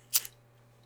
Sound effects > Other
LIGHTER FLICK 13
zippo, flick